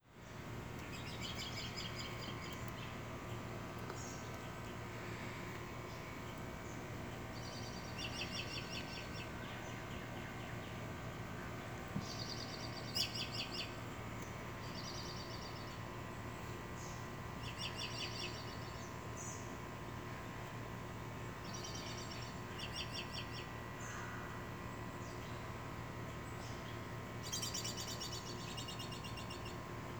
Soundscapes > Nature
AMBRurl Birds, Faint Room Tone Buzzing, Light Breeze Through Trees Nicholas Judy TDC
Birds chirping with faint room tone buzzing and light breeze through trees.